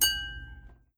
Sound effects > Objects / House appliances
A glass ding.